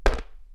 Sound effects > Other
apply damage
4 - Applying damage, both ally and enemy Foleyed with a H6 Zoom Recorder, edited in ProTools
hit, hurt, damage